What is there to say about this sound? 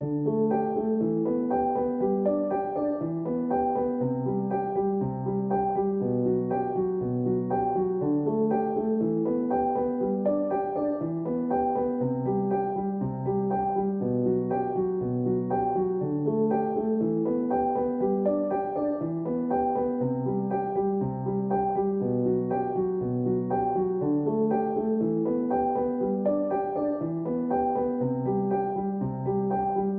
Music > Solo instrument
Piano loops 184 octave long loop 120 bpm
loop, simplesamples, 120, simple, pianomusic, free, reverb, music, 120bpm, piano, samples